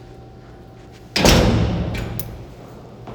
Sound effects > Other
GUNAntq old gun fire DOI FCS2
old gun fire